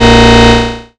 Instrument samples > Synths / Electronic
DRILLBASS 1 Bb
fm-synthesis, additive-synthesis